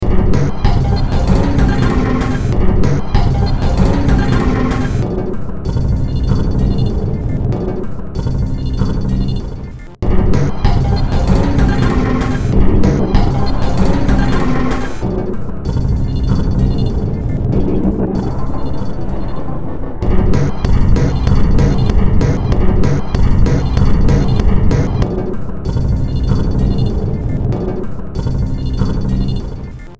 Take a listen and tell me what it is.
Music > Multiple instruments
Short Track #3339 (Industraumatic)
Soundtrack, Sci-fi, Cyberpunk, Horror, Industrial, Underground, Ambient, Noise, Games